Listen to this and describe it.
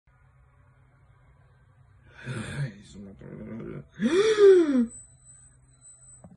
Sound effects > Human sounds and actions
Gasp/Breath of air
Gasps made by me for a college project.